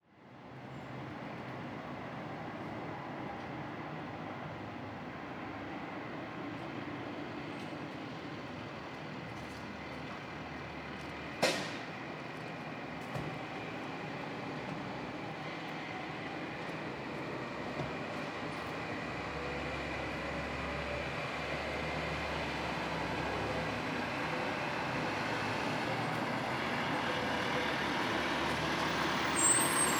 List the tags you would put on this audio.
Soundscapes > Urban
city
clang
construction
engine
field-recording
noise
traffic
truck
urban

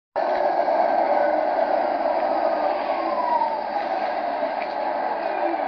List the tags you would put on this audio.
Sound effects > Vehicles
track
traffic
tram

